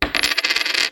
Sound effects > Objects / House appliances
OBJCoin-Samsung Galaxy Smartphone, CU Nickel, Drop, Spin 03 Nicholas Judy TDC
A nickel dropping and spinning.
drop, foley, nickel, Phone-recording, spin